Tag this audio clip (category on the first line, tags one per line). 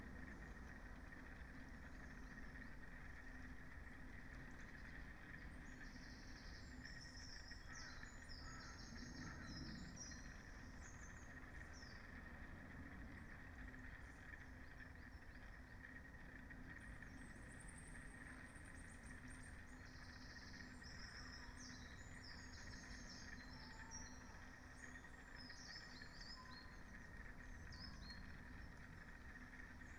Soundscapes > Nature
artistic-intervention
field-recording
phenological-recording
sound-installation
weather-data